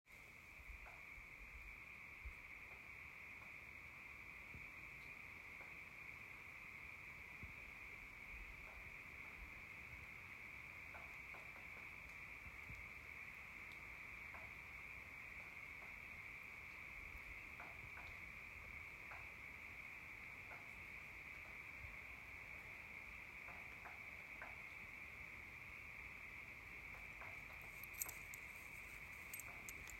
Soundscapes > Nature
Sound of frogs in the night